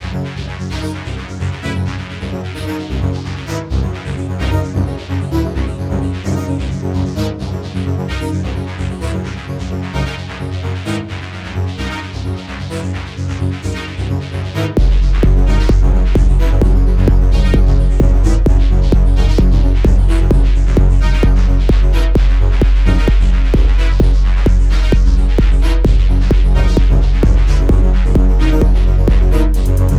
Music > Other
Enemy encounter (loopable)
I made this song with 130 bpm (classic), made in FL Studio, instruments: FLEX (7th soul), Fruit kick, 808 hihat
RPG, Battle, Enemy, Action, Music, Game